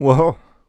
Speech > Solo speech
Surprised - Wohoh
dialogue, FR-AV2, Human, impressed, Male, Man, Mid-20s, Neumann, NPC, oneshot, singletake, Single-take, surprised, talk, Tascam, U67, Video-game, Vocal, voice, Voice-acting, wow, wowed